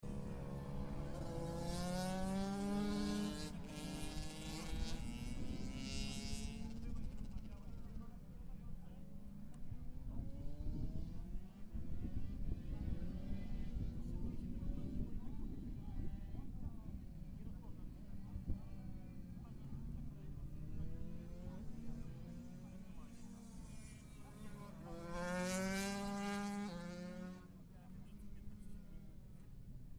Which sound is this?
Soundscapes > Other
Supermoto Polish Championship - May 2025 - vol.12 - Racing Circuit "Slomczyn"
Recorded on TASCAM - DR-05X; Field recording on the Slomczyn racetrack near Warsaw, PL; Supermoto Championship.
smolczyn, warszawa, race, supermoto, racetrack, motorcycle, moto, motocross, engine, motor